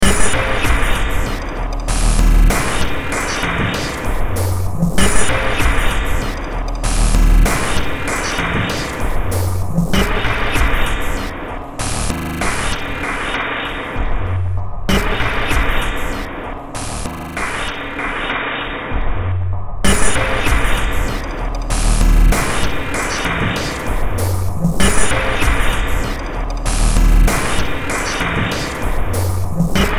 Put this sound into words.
Music > Multiple instruments
Demo Track #3632 (Industraumatic)
Soundtrack, Noise, Ambient, Industrial, Sci-fi, Underground, Games